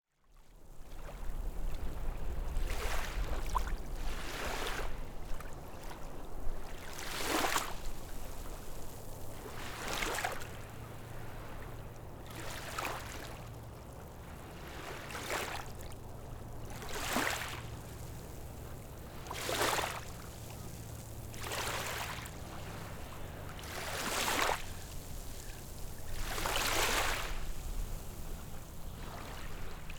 Soundscapes > Nature
Soft Waves On A Sand Beach Slowly Getting Stronger
Recorded with Zoom H6 XY-Microphone. Location: Aegina / Greece; placed on the beach near the waves
field-recording, beach, water, waves, nature, sea, ocean, sand